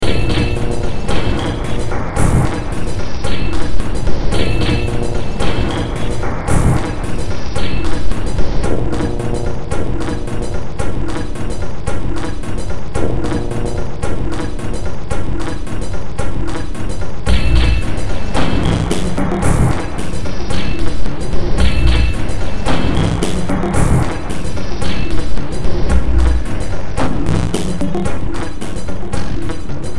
Music > Multiple instruments
Demo Track #3012 (Industraumatic)
Ambient, Cyberpunk, Games, Horror, Industrial, Noise, Sci-fi, Soundtrack, Underground